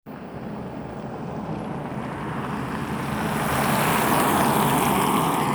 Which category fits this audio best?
Soundscapes > Urban